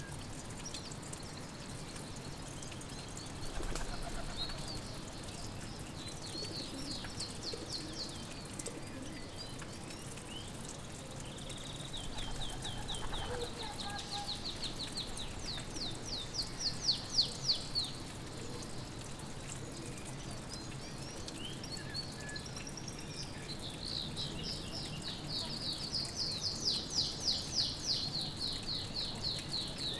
Soundscapes > Nature
Birds under the waterfall
Birds nests under a waterfall
birds, forest, stream, water, waterfall